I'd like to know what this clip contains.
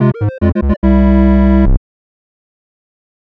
Music > Multiple instruments
Victory Fanfare (8-Bit Flag) 1
cinematic-hit, discover-location, discovery, dylan-kelk, fanfare, find-item, find-key, get-item, level-up, mission-complete, quest-complete, rpg, rpg-video-game, triumph, triumphant, victory, video-game, video-game-level-up, video-game-mission-complete